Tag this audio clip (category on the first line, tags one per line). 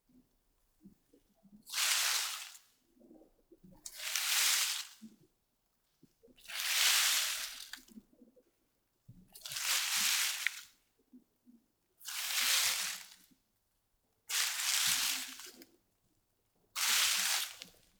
Sound effects > Human sounds and actions
brushing
forest
leaves
rustle